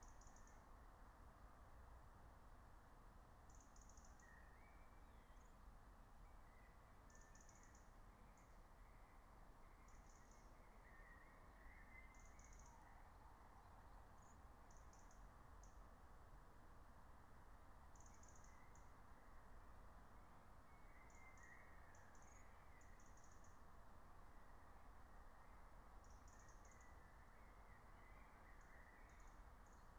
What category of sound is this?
Soundscapes > Nature